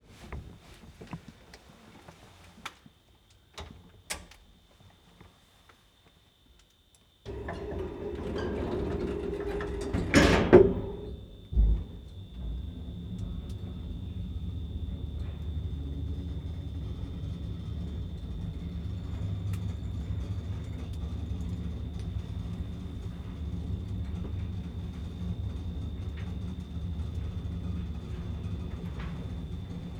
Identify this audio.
Sound effects > Other mechanisms, engines, machines
MACHElev-XY Zoom H4e Elevator in the house SoAM Sound of Solid and Gaseous Pt 1 Elevators
doors, opening, contact, lift, button, elevator